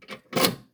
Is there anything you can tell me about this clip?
Sound effects > Objects / House appliances
inserting key 3
Here is a sound of me inserting a small key into a small container.
metal, Insert, key, metallic